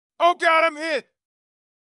Speech > Solo speech
Woman screaming ,"Oh God, I'm hit." It's a recording of me using a Shure M58. Then transposed up and processed with Logic Pro on a MacBook Air.
dying, Injury